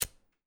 Sound effects > Objects / House appliances
Striking a yellow BIC lighter in a room next to a window on a cloudy day without rain
flame, light, lighter, striking